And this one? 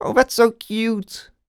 Speech > Solo speech
Affectionate Reactions - Oh thats so cute
Male, cute, affectionate, Human, dialogue, talk, Voice-acting, U67, voice, words, Video-game, singletake, Neumann, Tascam, Man, NPC, Vocal, Single-take, oneshot, FR-AV2, best-of, Mid-20s